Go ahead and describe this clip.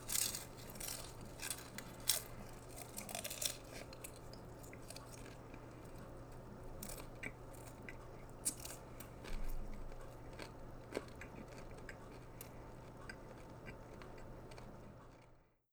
Sound effects > Human sounds and actions
FOODEat-Blue Snowball Microphone Popcorn Nicholas Judy TDC
Someone eating popcorn.
human, popcorn, eat, foley, Blue-Snowball, Blue-brand